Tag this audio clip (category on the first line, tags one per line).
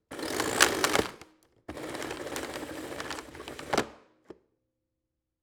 Sound effects > Objects / House appliances
7000
aspirateur
cleaner
FR-AV2
Hypercardioid
MKE-600
MKE600
Powerpro
Powerpro-7000-series
Sennheiser
Shotgun-mic
Shotgun-microphone
Single-mic-mono
Tascam
Vacum
vacuum
vacuum-cleaner